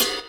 Instrument samples > Percussion
bellride weak 2 semibrief
2 bellrides mixed and low-pitched. Please remind me to use it.
Amedia, Hammerax, cymbal, ridebell, Soultone, Istanbul, ping, Bosphorus, bell, bellride, cup, ride, Mehmet, Sabian, Crescent, Meinl, metal-cup, click-crash, Paiste, Agean, Diril, crashcup, cymbell, bassbell, bellcup, Istanbul-Agop, Zildjian, Stagg, cupride